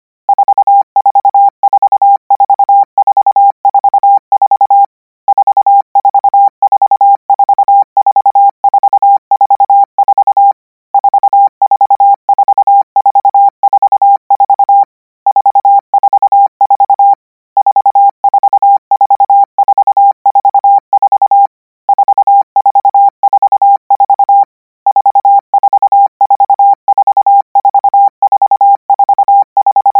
Sound effects > Electronic / Design
Koch 32 4 - 200 N 25WPM 800Hz 90%
Practice hear number '4' use Koch method (practice each letter, symbol, letter separate than combine), 200 word random length, 25 word/minute, 800 Hz, 90% volume.
code, codigo, numbers, numeros, radio